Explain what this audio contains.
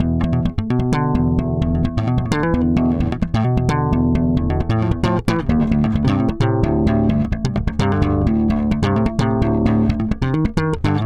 Music > Solo instrument
tappy slap riff 2
riffs, chords, electricbass, note, lowend, harmonics, low, bass, basslines, blues, pick, slap, rock, electric, harmonic, bassline, chuny, funk, fuzz, riff, slide, pluck, slides, notes